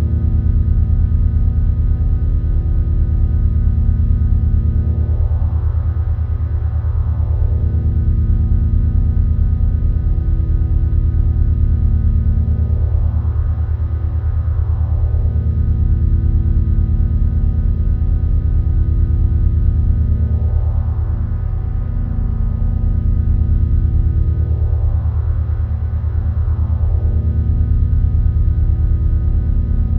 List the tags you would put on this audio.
Soundscapes > Synthetic / Artificial
soundscape
ambience
ambient
horror
drone
synth
atmosphere
sci-fi
background
artificial

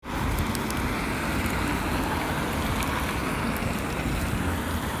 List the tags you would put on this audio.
Sound effects > Vehicles
auto
car
city
field-recording
street
traffic

